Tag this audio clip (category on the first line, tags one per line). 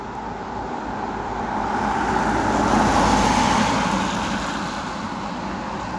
Soundscapes > Urban
Drive-by
field-recording
Car